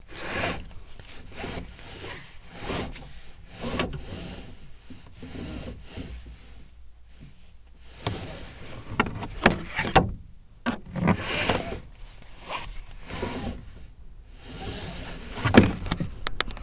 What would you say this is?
Sound effects > Vehicles
Pulling Seatbelt in Car
The pulling sound of a seatbelt. Recorded with a 1st Generation DJI Mic and Processed with ocenAudio
belt, car, passenger, pulling, safety, seat, seatbelt, vehicle